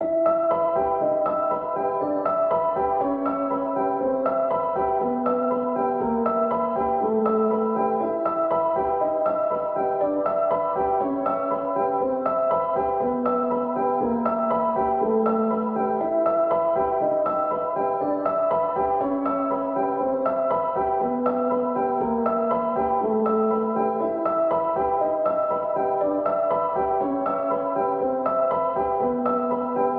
Music > Solo instrument
Piano loops 188 efect 4 octave long loop 120 bpm
simplesamples, free, piano, reverb